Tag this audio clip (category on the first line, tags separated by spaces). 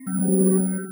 Sound effects > Electronic / Design
alert confirmation digital interface message selection